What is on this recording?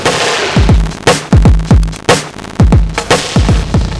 Music > Solo percussion
Industrial Estate 34
120bpm, Ableton, chaos, industrial, loops, soundtrack, techno